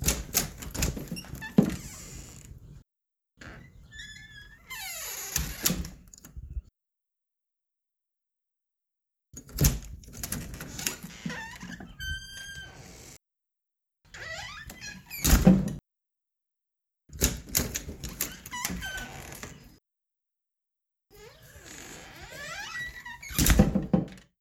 Sound effects > Objects / House appliances
DOORWood-Samsung Galaxy Smartphone, CU Front, Open, Close, Squeaks Nicholas Judy TDC
Front door open and close with squeaks.
close, front, door, squeak, open